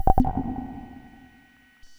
Instrument samples > Synths / Electronic
Benjolon 1 shot2
NOISE, DRUM, SYNTH, CHIRP, 1SHOT, HARSH, MODULAR